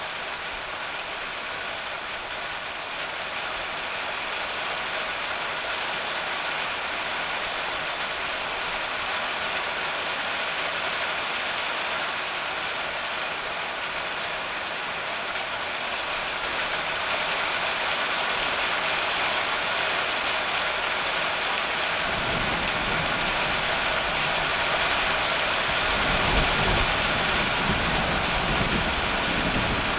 Sound effects > Natural elements and explosions
RAINMetl 32bF Heavy Rain on Metal Roof thunder and Drips
Heavy rain on a metal roof recorded from the outside. Roll of thunder and water dripping recorded with a Zoom H6